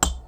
Music > Solo percussion
TOONMx-Blue Snowball Microphone, CU Xylophone, Teakwood, Thai, Eye Blink Nicholas Judy TDC
A teakwood thai xylophone eye blink.
blink, Blue-brand, Blue-Snowball, cartoon, eye, teakwood, thai, xylophone